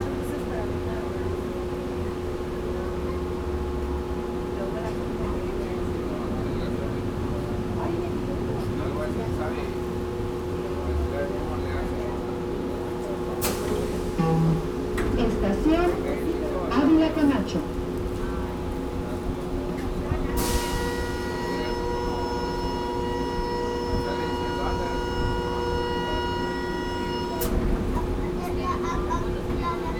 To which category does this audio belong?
Soundscapes > Urban